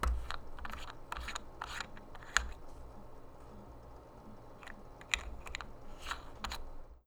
Sound effects > Objects / House appliances
A Blistex Lip Medex lid opening and closing.